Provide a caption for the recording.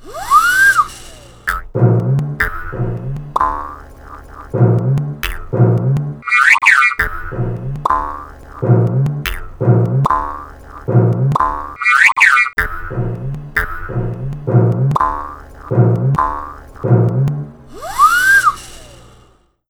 Sound effects > Electronic / Design
A comedic montage of bouncing and zinging. Clown montage.